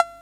Instrument samples > Synths / Electronic
Clawbell-CR5000 05
A handful of samples and drum loops made with Roland CR5000 drum machine. Check the whole pack for more
CompuRhythm, Vintage, Analog, Drums